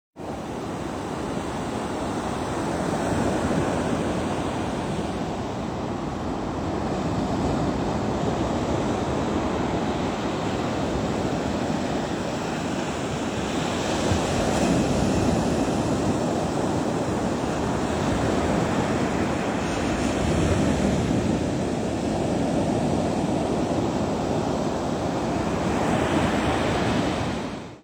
Soundscapes > Nature
The Sea on a Winter Night
Recorded on the 22nd November 2025 at Bowleaze Cove in Weymouth, Dorset. Captured with a Google Pixel phone.